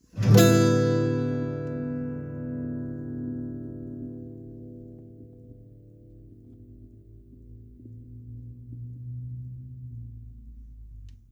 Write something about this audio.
Music > Solo instrument
MUSCPluck Guitar, Strum, Single, Ascending Up To A Single Note Nicholas Judy TDC
A single guitar strum that ascends up into a single note.
strum, guitar, Phone-recording, ascending